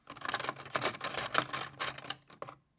Sound effects > Objects / House appliances
forks handling2
foley, cutlery, silverware, forks, kitchen